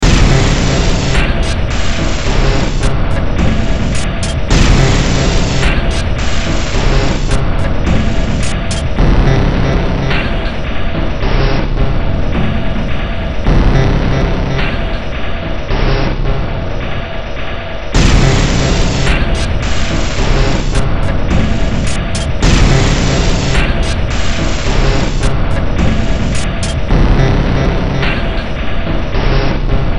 Music > Multiple instruments
Demo Track #3784 (Industraumatic)
Underground, Games, Soundtrack, Horror, Sci-fi, Ambient, Noise, Cyberpunk, Industrial